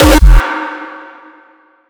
Instrument samples > Percussion
Used Grvkicks and a Chromo Kick from FLstudio original sample pack. I used Plasma to boost 200-400 HZ to make it sounds digital and plastical. Cunchy Bass with a Grvkick added waveshaper and Fruity Limiter. And rumble used ZL EQ, Fruity Reeverb 2 and added waveshaper and Fruity Limiter too. The reversed gate noise parallelly conneted with dry sounds of its TOC, and just used Fruity Reeverb 2 and ZL EQ to make it screeching. Well altough it sounds bad, but I think can use it standard stage of hardstyle producing.